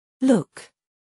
Speech > Solo speech
to look
english, pronunciation, voice, word